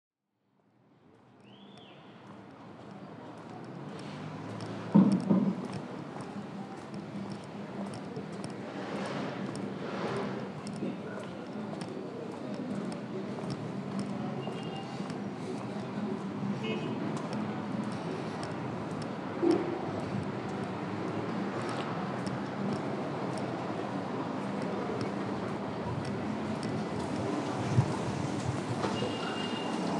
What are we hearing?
Soundscapes > Urban
Soundscape in the Puerto neighborhood of Valparaiso. We hear footsteps, metalic doors, people chatting in the distance and the sound of a cumbia through a device.